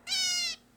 Sound effects > Animals
An owl finch, also known as a double-barred finch, makes a drawn-out call. Recorded with an LG Stylus 2022.